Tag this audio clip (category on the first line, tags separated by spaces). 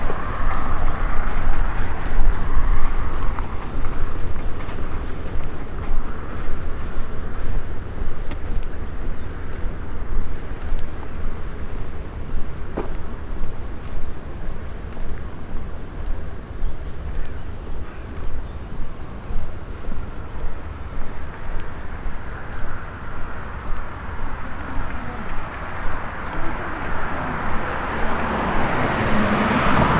Soundscapes > Urban
2025 81000 Albi City Early Early-morning France FR-AV2 french hand-held handheld market Mono morning NT5 Occitanie Outdoor people Rode Saturday Single-mic-mono Tarn Tascam urbain walking walk-though walkthough Wind-cover WS8